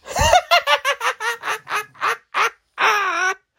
Sound effects > Human sounds and actions
Evil clown laugh
clown, laugh, joker, evil